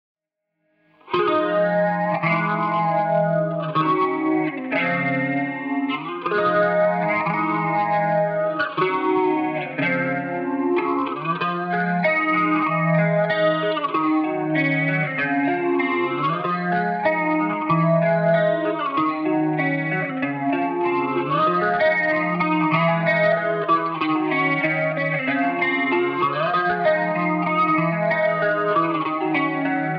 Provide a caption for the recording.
Music > Solo instrument
Here is a dreamy guitar sample that I record in my home studio having fun with a few guitar toys Guitars: Jazzmaster Fender Mexico, Faim Stratocaster (Argentina) pedalboard: Behringer graphic eq700 Cluster mask5 Nux Horse man Fugu3 Dédalo Electro Harmonix Keys9 Maquina del tiempo Dédalo Shimverb Mooer Larm Efectos Reverb Alu9 Dédalo Boss Phase Shifter Mvave cube baby 🔥This sample is free🔥👽 If you enjoy my work, consider showing your support by grabbing me a coffee (or two)!